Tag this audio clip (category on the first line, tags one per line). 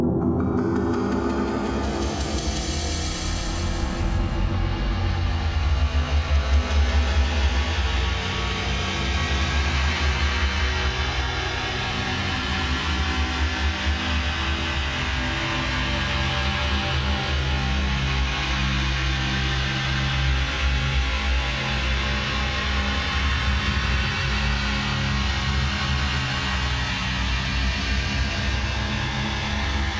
Soundscapes > Synthetic / Artificial
alien
ambience
ambient
atmosphere
bass
bassy
dark
drone
effect
evolving
experimental
fx
glitch
glitchy
howl
landscape
long
low
roar
rumble
sfx
shifting
shimmer
shimmering
slow
synthetic
texture
wind